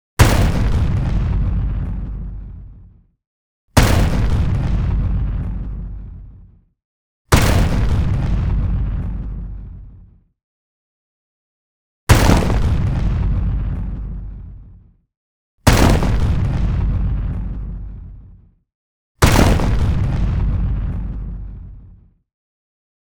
Sound effects > Natural elements and explosions
custom heavy explosive crunchy impact sound 10082025
custom_heavy_explosive_crunchy_impact_sound inspired by the fate stay night sound redesign videoes.
bang, destruction, explode, fate, noise, rock